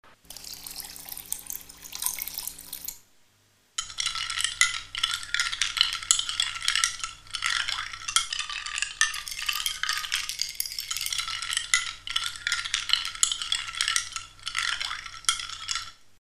Sound effects > Objects / House appliances
agua con hielo (water and ice)
Sirviendo agua (u otro líquido) en un vaso en el que hay cubos de hielo, y revolviendo. Pouring water (or other liquid) into a glass containing ice cubes, and stirring them.